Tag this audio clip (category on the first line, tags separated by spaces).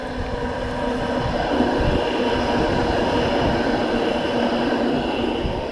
Sound effects > Vehicles
Finland Public-transport Tram